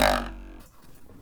Sound effects > Other mechanisms, engines, machines
Handsaw Beam Plank Vibration Metal Foley 12
foley, fx, handsaw, hit, household, metal, metallic, perc, percussion, plank, saw, sfx, shop, smack, tool, twang, twangy, vibe, vibration